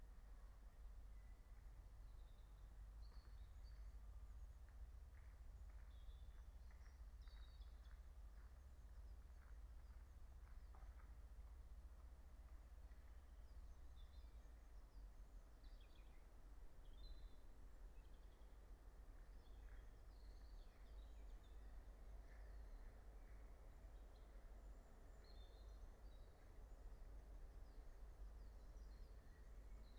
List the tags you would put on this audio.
Soundscapes > Nature
raspberry-pi soundscape natural-soundscape phenological-recording meadow

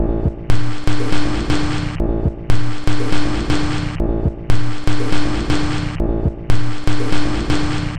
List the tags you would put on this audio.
Instrument samples > Percussion
Soundtrack Drum Dark Samples Loop Ambient Weird Underground Alien Packs Loopable

